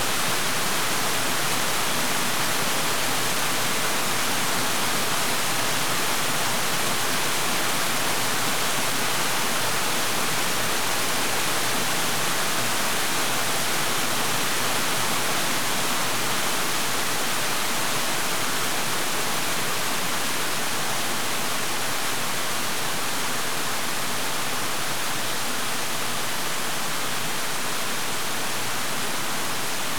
Soundscapes > Other
A morning recording at Teggnose Reservoir, Peak District.
water, spillway, flow, reservoir, resevoir